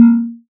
Instrument samples > Synths / Electronic
CAN 2 Bb
additive-synthesis, bass